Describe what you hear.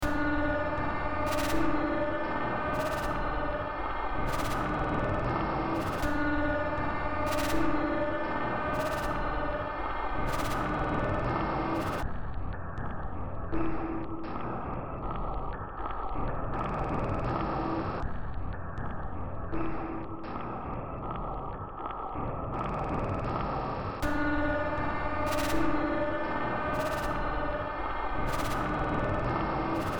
Music > Multiple instruments
Demo Track #3210 (Industraumatic)
Soundtrack, Games